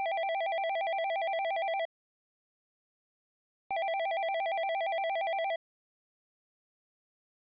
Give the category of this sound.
Sound effects > Electronic / Design